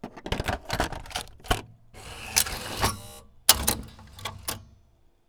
Sound effects > Other mechanisms, engines, machines
U-matic VTR tape insert sound 2
At the very start of the sound you can hear the cassette being inserted then shortly after the front loading mechanism takes the cassette inside the machine. Recorded with a Zoom H1n.